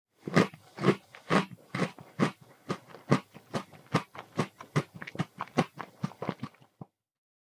Sound effects > Other
FOODEat Cinematis RandomFoleyVol2 CrunchyBites MacadamiaNutsBite ClosedMouth NormalChew Freebie
bag, bite, bites, crunch, crunchy, design, effects, foley, food, handling, macadamia, nuts, plastic, postproduction, recording, rustle, SFX, snack, sound, texture